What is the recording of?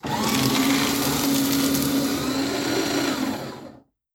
Sound effects > Objects / House appliances
MACHOffc-Samsung Galaxy Smartphone, CU Paper Shredder, Start, Shred Paper, Stop Nicholas Judy TDC
A Fellowes paper shredder starting, shredding paper and stopping.
paper, paper-shredder, Phone-recording, shred, start, stop